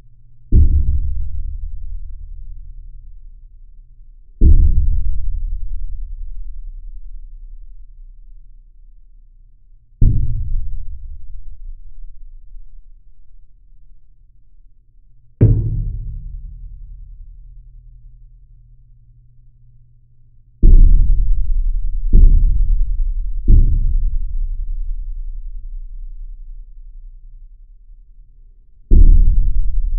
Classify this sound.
Sound effects > Other mechanisms, engines, machines